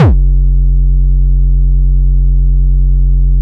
Instrument samples > Percussion
8 bit-808 Kick 1
8-bit, FX, game, percussion